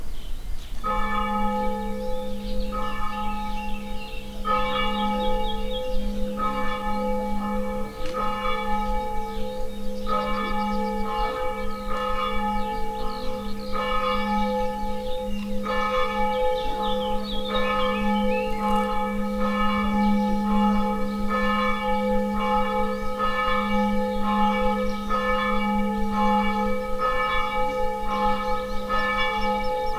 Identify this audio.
Soundscapes > Urban
250427-11h55 Gergueil Bells
Subject : The bells ringing in Gergueil. recorded from a few streets away. Date YMD : 2025 04 27 11h55 Location : Gergueil France. Hardware : Zoom H5 stock XY capsule. Weather : Processing : Trimmed and Normalized in Audacity.
Gergueil, Outdoor, Spring, Zoom